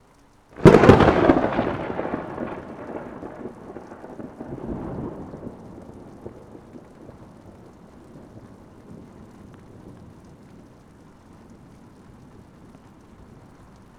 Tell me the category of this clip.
Sound effects > Natural elements and explosions